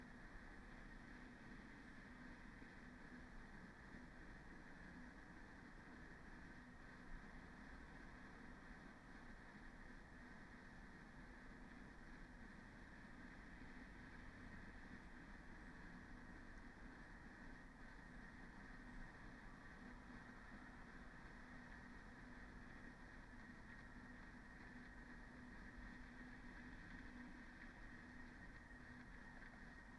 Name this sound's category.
Soundscapes > Nature